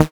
Instrument samples > Synths / Electronic
CINEMABASS 8 Eb
bass, fm-synthesis